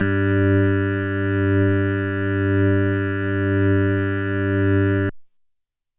Instrument samples > Synths / Electronic
Synth organ patch created on a Kawai GMega synthesizer. G4 (MIDI 67)
strange, melodic, organ, synthesizer, bass